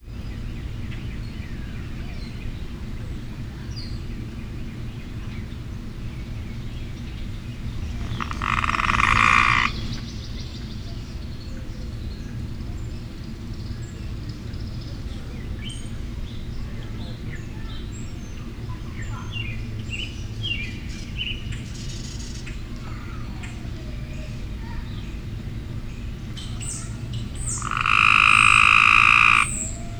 Soundscapes > Nature
Backyard Tree Frog May 13 2025 vTWO
A very nice surprise as I was going through this recording from a friend's backyard on a very pleasant, mildish-early spring day. A very vocal tree frog decided to "sing" out several times during the mid-morning. Now they are quite capable of producing a very loud call, but still, the loudness of this call-out has me thinking that the tree frog was very close to my recording set up. Recorded on May 13 2025 in Carbondale, a small town in southern Illinois using my Zoom F4 and one Lom UsiPro Omnidirectional microphone.
Field-recording, Frog, Nature, Naturesound